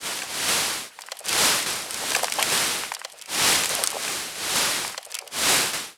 Sound effects > Electronic / Design
Scavenging Garbage
Is something shining between the trash bags? Might as well have a look...
rubbish, searching, garbage, trash, scavenging